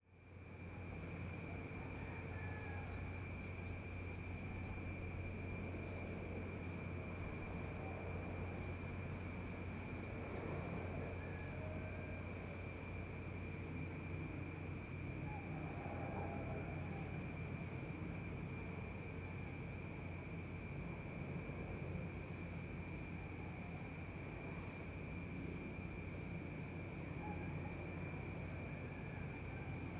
Soundscapes > Other
250729 2928 PH Early morning in a calm filipino suburban area
Early morning atmosphere in a calm Filipino suburban area, with fog horn. I made this recording at about 4:00AM, from the terrace of a house located at Santa Monica Heights, which is a costal residential area near Calapan city (oriental Mindoro, Philippines). One can hear the atmosphere of this place early morning, with crickets and other insects chirping, as well as very distant roosters,, fishermen’s motorboats, machineries, cows mowing, dog barking, and more. At #12:10, one can hear clearly the fog horn of a ship leaving the harbour of the city. Recorded in July 2025 with an Olympus LS-P4 and a Rode Stereo videomic X (SVMX). Fade in/out applied in Audacity.
motorboat, rooster, early-morning, soundscape, calm, roosters, dog, breeze, dogs